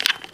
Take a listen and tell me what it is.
Sound effects > Objects / House appliances
Picking Up A Small Item
A small item being picked up in an inventory. This is actually a piece of handling sound of a Canon EOS M50, normalized and extracted using Audacity.